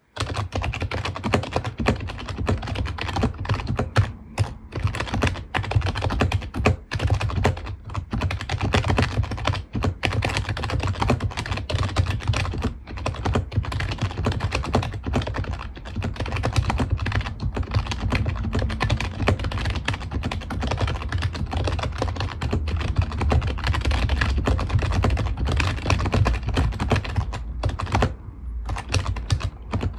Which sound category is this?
Sound effects > Other mechanisms, engines, machines